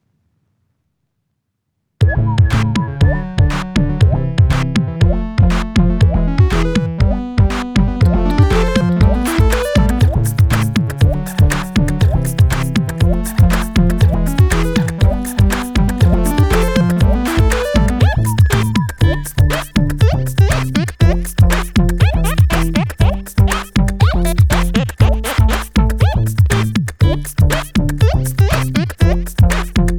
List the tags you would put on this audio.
Music > Other
lol
120
Crazy
Gargly

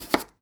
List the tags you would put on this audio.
Sound effects > Other
Chief
Chop
Cook
Cooking
Cut
House
Household
Indoors
Kitchen
Knife
Slice
Vegetable

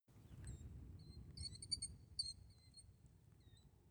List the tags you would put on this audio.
Sound effects > Animals
bird
birds
chirp
field-recording
killdeer
nature
plover
shorebird
swamp
wetland

